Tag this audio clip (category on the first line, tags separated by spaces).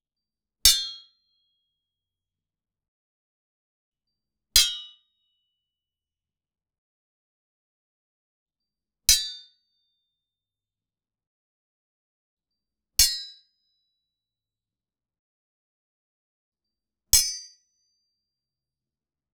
Sound effects > Objects / House appliances
weaponry fight impact metal knife clang combat hit ringout blade ring melee attack kung-fu fighting medieval battle silverware clank martialarts clash designed metallic duel sai karate psai weapon